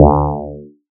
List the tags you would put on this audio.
Instrument samples > Synths / Electronic
additive-synthesis bass

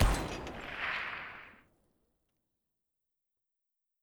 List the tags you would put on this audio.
Sound effects > Other mechanisms, engines, machines
canon shot

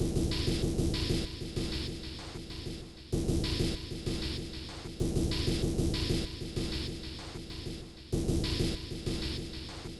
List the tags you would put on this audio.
Instrument samples > Percussion
Alien Packs Samples Underground

